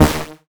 Instrument samples > Synths / Electronic
CINEMABASS 2 Eb
additive-synthesis, bass, fm-synthesis